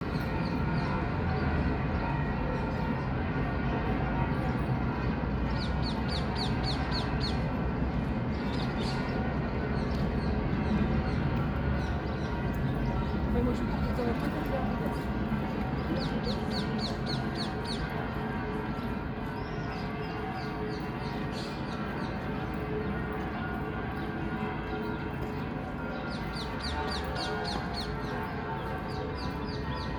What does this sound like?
Soundscapes > Urban
Sevilla bells birds cars 19 may 2024
Sounds of church bells pealing, birds tweeting and distant traffic in Seville, Spain in 2024. Recording device: Samsung smartphone.
Spain, birds, Sevilla, church-bells